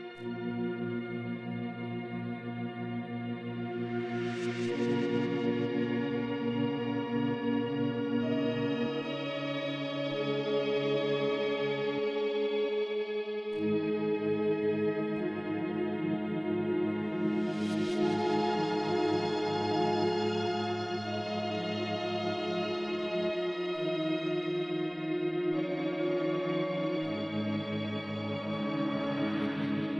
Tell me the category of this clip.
Music > Solo instrument